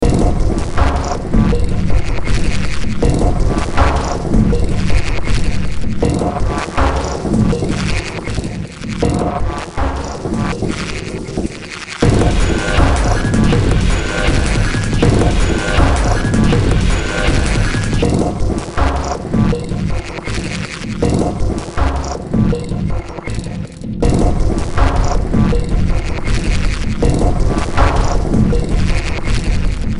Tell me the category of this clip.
Music > Multiple instruments